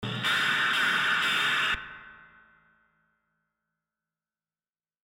Sound effects > Experimental
lIVE aUDIO2
Recorded in various ways, with way to many sound effects on them
synth, experimental, electronic